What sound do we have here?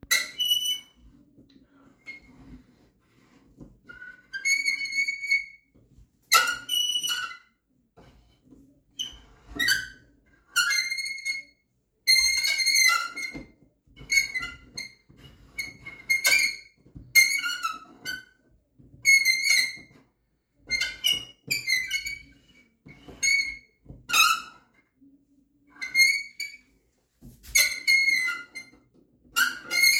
Sound effects > Other mechanisms, engines, machines
METLFric-Samsung Galaxy Smartphone, CU Movements, Squeaks, Squeals Nicholas Judy TDC
Metal movements, squeaks and squeals.
squeak, Phone-recording, squeal, movement, metal